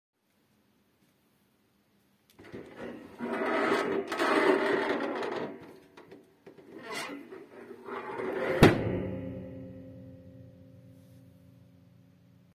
Sound effects > Objects / House appliances
Opening and closing the bunk of my squeaky dorm bed.